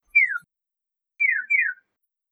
Sound effects > Other
pyio-pyio sound as recorded at traffic light in Japan, all noise and reverb removed
ambience, chirp, crosswalk